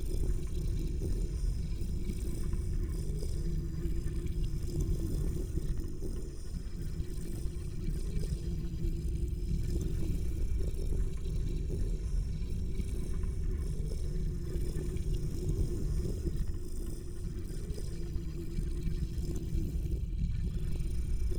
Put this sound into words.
Soundscapes > Nature
Created for the video game DystOcean, I made all sounds with my mouth + mixing. Loops perfectly.